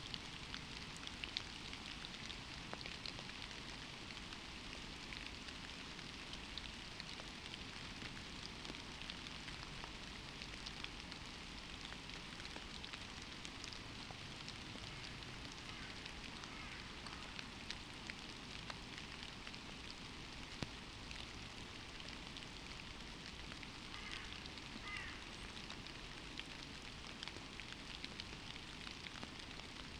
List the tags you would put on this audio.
Soundscapes > Nature
alice-holt-forest artistic-intervention data-to-sound Dendrophone modified-soundscape natural-soundscape sound-installation soundscape

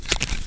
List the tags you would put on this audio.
Sound effects > Objects / House appliances
collect grabbing inventory taking collecting

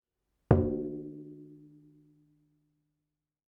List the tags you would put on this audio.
Music > Solo percussion
16-inch 40cm drum percussion percussive shamanic skin sound

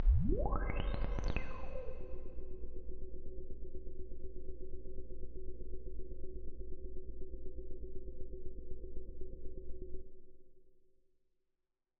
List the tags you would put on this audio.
Soundscapes > Synthetic / Artificial
noise scifi cinematic sci-fi dark-techno mystery drowning horror PPG-Wave noise-ambient dark-design science-fiction vst dark-soundscapes sound-design content-creator

